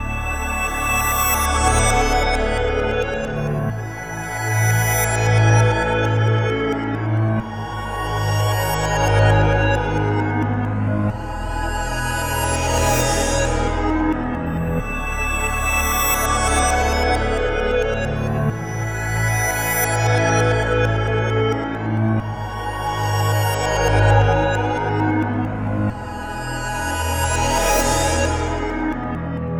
Music > Multiple instruments
Siren (130 BPM, 16 Bars) Reversed
reverse-record, ocean-level, ocean-biome, reversed-audio, water-biome, rewind, water-level, rewind-time, Dylan-Kelk, Lux-Aeterna-Audio, orchestral, rewinding-effect, backwards-audio